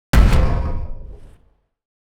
Sound effects > Other
Sound Design Elements Impact SFX PS 071
impact, sfx, rumble, hard, cinematic, sound, force, heavy, thudbang, smash, transient, percussive, collision, hit, blunt, strike, game, explosion, sharp, design, power, effects, audio, crash, shockwave